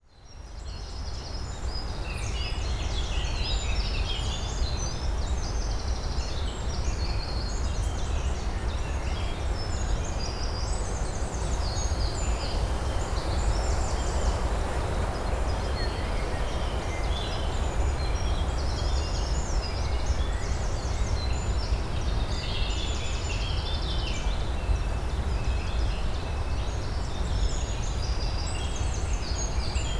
Soundscapes > Nature
A recording from a recent visit to Macclesfield Forest. Morning time.